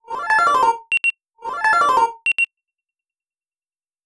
Sound effects > Objects / House appliances
improved ringtone
I used FL Studio 21 and Audacity to make this sound.
mobile, phone, ring, ringtone, smartphone